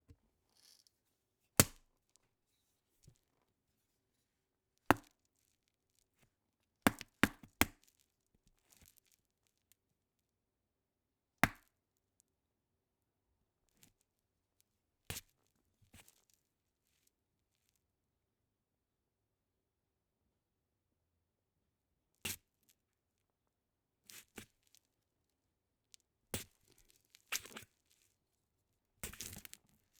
Sound effects > Other
Water Melon Stabs and impacts
Foley of a water melon being stabbed and hit with a kitchen knife. Goes well with some reverb.